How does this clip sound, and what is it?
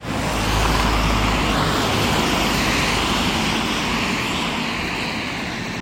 Sound effects > Vehicles
Car driving 8
drive, engine, road, hervanta, car, outdoor, tampere